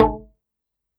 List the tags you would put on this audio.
Instrument samples > Other
pluck bass string

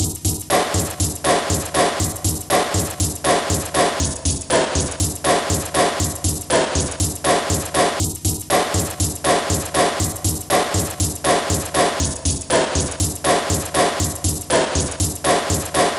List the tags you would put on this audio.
Instrument samples > Percussion
Loop Packs Drum Alien Weird Underground Ambient Samples Industrial Soundtrack Dark Loopable

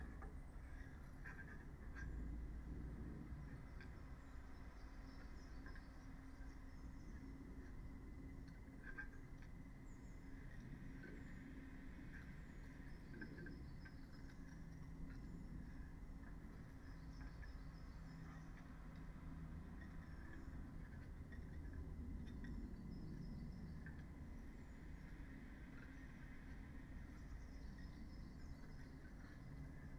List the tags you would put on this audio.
Soundscapes > Nature
alice-holt-forest data-to-sound Dendrophone modified-soundscape natural-soundscape raspberry-pi sound-installation soundscape

